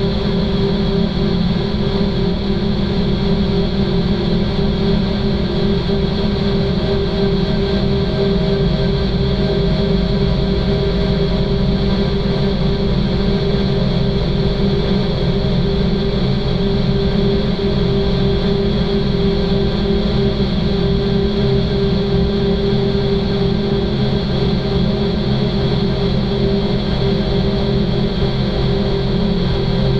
Sound effects > Experimental
"The despair grew into something with its own motivations and goals." For this upload I placed a Zoom H4n multitrack recorder in the center of a room in my home and captured ambient noises. Then, with Audacity, I tinkered with effects such as pitch and speed to create the final piece here.
heavy, melancholy, zoom-h4n